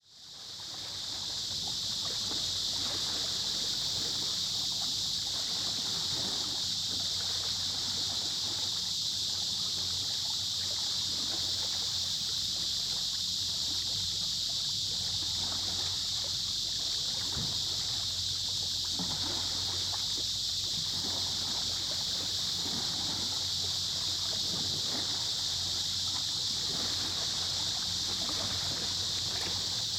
Soundscapes > Nature
Mississippi Missouri RiverConfluence
09/02/2025 ~ 3:00pm Sitting at the confluence point of the Mississippi and Missouri River Rolling with the Zoom f8n Pro and Audio Technica BP4025
mississippiriver, fieldrecording, bp4025, confluence, missouririver